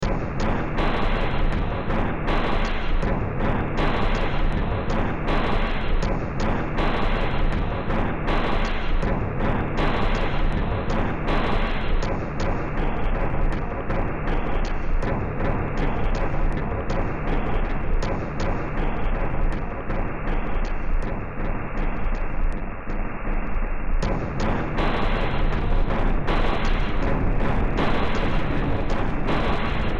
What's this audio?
Music > Multiple instruments
Demo Track #3110 (Industraumatic)
Industrial, Horror, Cyberpunk, Soundtrack, Ambient, Underground, Sci-fi, Games, Noise